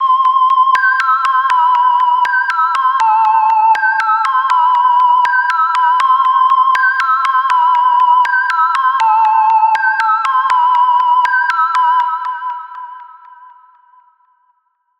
Sound effects > Electronic / Design
A gentle, nostalgic melodic loop featuring a marimba or soft synth-bell instrument. The sound has a rhythmic, delayed quality that repeats a simple, sentimental motif, evoking feelings of reflection or calm.
electronic, sound-design, sound-effect, fx, synthetic